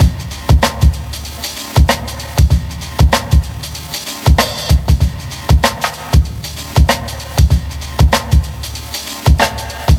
Music > Solo percussion
bb drum break loop spy 96
Vinyl, Lo-Fi, Drum, DrumLoop, Breakbeat, Drum-Set, 96BPM, Dusty